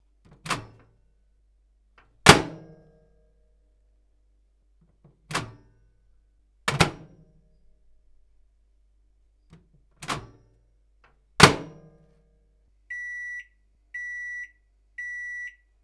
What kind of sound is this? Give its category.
Sound effects > Objects / House appliances